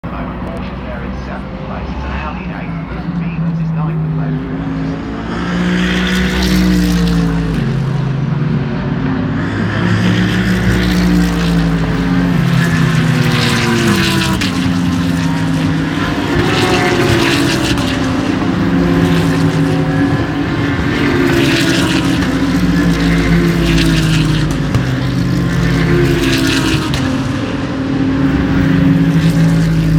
Soundscapes > Urban
Racetrack 2 (GT3 at Brands Hatch)
2024 GT3/4 races at brands hatch. Loud supercars constantly passing by. Commentators faintly heard.
race, engine